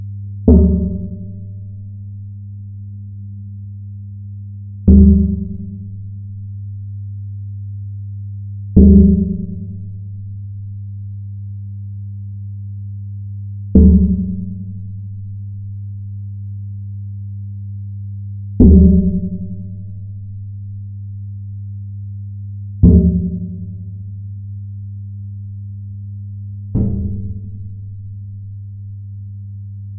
Sound effects > Other mechanisms, engines, machines
METLTonl Gas Heater Flue Pipe
Strikes on an internal gas heater flue pipe with fan hum.